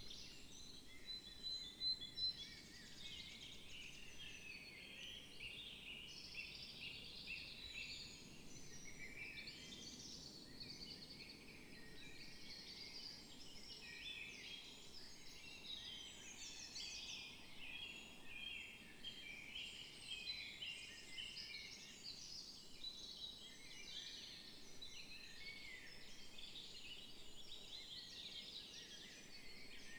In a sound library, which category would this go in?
Soundscapes > Nature